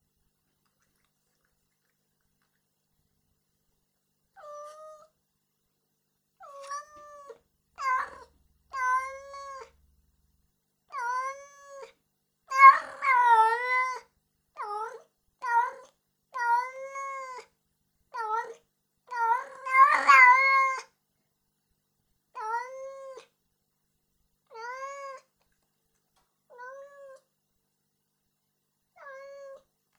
Sound effects > Animals
Cat meow early evening.
A young female cat meowing to be let out early evening.
female, meowing, cat, meow, feline, purr, purring